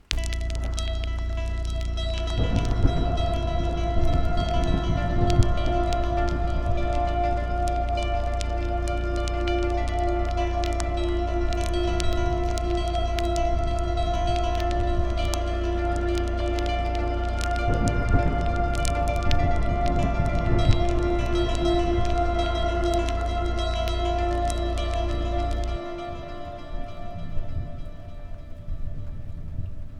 Music > Multiple instruments
Apocalyptic guitar short
Short piece inspired by the Left for Dead Series. Hope you enjoy! Also don't forget to leave a rating as it really helps!
ambiance, Creepy, Guitar, haunted, Horror, scary, soundscape, spooky